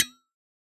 Sound effects > Objects / House appliances
Solid coffee thermos-014
sampling, percusive, recording